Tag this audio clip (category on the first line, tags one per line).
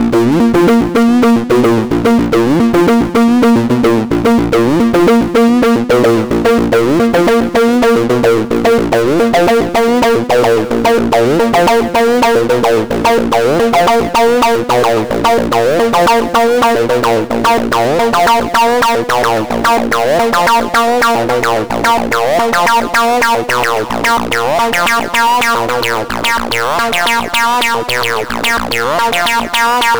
Music > Solo instrument
303
acid
bass
club
dance
electro
electronic
house
lfo
loop
rave
synth
techno
trance